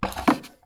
Sound effects > Other mechanisms, engines, machines
Dewalt 12 inch Chop Saw foley-041
Blade, Tooth, Foley